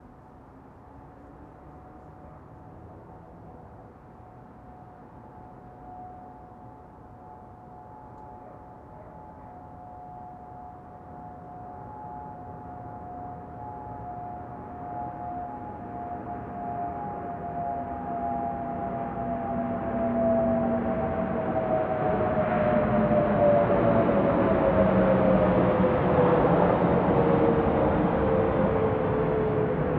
Sound effects > Vehicles
A large jet plane flies past from right to left 2
A large jet aircraft is performing an approach maneuver, recorded using a ZOOM F8N Pro recorder and a RODE NT-SF1 microphone. The recorded signal has been converted to stereo.
airlines, airplane, airport, engines, flight, fly, jet, landing, maneuver, noise, takeoff